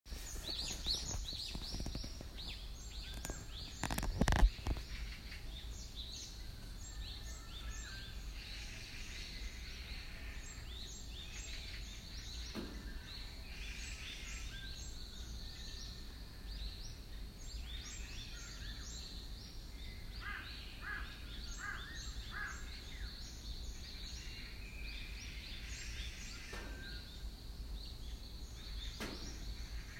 Soundscapes > Nature
Birds on Spring Forest in Ansan-si, South Korea